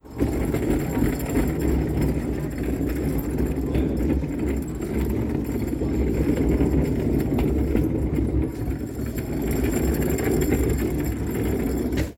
Sound effects > Objects / House appliances
OBJWhled-Samsung Galaxy Smartphone, CU Wheelie Bin Rolling Nicholas Judy TDC
A wheelie bin rolling. Recorded at Lowe's.
Phone-recording, roll, wheelie-bin, wheels